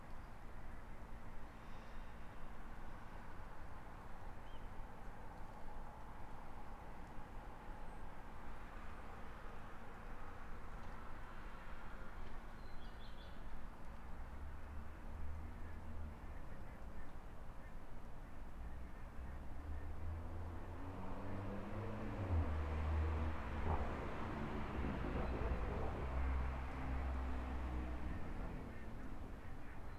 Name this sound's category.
Soundscapes > Urban